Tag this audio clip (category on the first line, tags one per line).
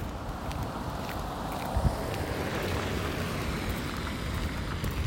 Soundscapes > Urban
tampere
vehicle
car